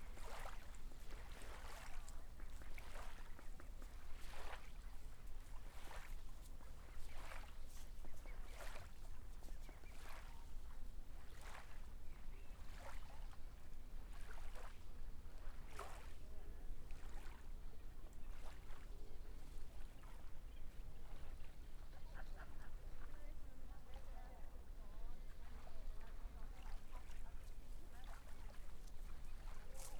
Soundscapes > Urban
AMBSea Bay beach on a hot evening, bridge squeaking, people and mallards, Karlskrona, Sweden
Recorded 19:33 10/07/25 At Studentviken. In the beginning the waves lap and a group of mallards walk in the sand in front of the microphone. They later swim in the water instead. Meanwhile a group of retirees talk at a nearby table. Further forward is a squeaky bridge where some people take a dip. Some blackbirds, jackdaws and pigeons also sound. Zoom H5 recorder, track length cut otherwise unedited.
Beach, Bridge, Calm, Evening, Field-Recording, Flapping, Karlskrona, Lapping, Mallards, People, Sand, Squeaking, Summer, Sweden, Talking, Water, Waves, Wings